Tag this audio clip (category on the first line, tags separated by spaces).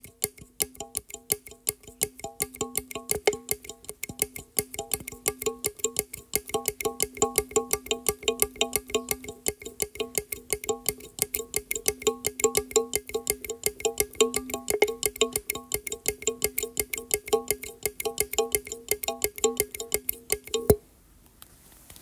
Music > Solo percussion
african can metallic hypnotic shamanism can-lid shamanic lid pling swing perc rhythm rhythms metal-percussion tribal groovy thumb-piano primal-rhythms kalimba primitive percussion groove